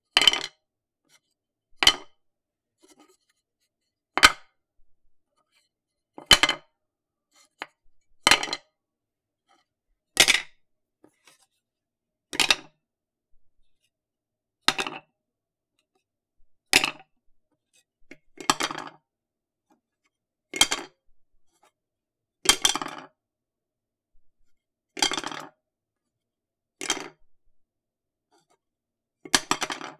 Sound effects > Objects / House appliances
wooden utensils a
Picking up and dropping some wooden utensils on a countertop multiple times.